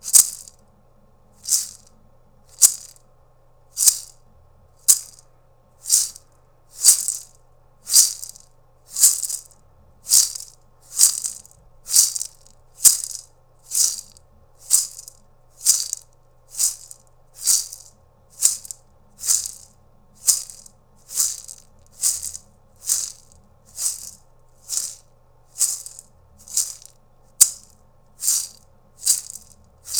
Music > Solo percussion
MUSCShake Maracas, Shake Nicholas Judy TDC
maraca, maracas